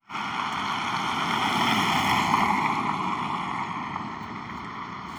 Sound effects > Vehicles
car passing 7
Sound of a car passing by in wet, cool weather, with winter tires on the car. Recorded using a mobile phone microphone, Motorola Moto G73. Recording location: Hervanta, Finland. Recorded for a project assignment in a sound processing course.
car, drive, vehicle